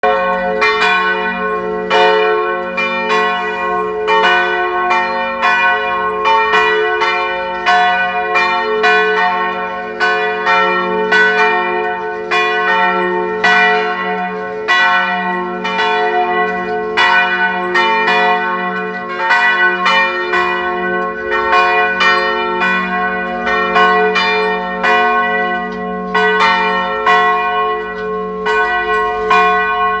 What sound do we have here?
Soundscapes > Urban
Church bell in Ipê, Rio Grande do Sul, Brazil. Recorded with a mobile phone Galaxy, no microphone
religion, acapella, faith